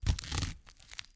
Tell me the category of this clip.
Sound effects > Experimental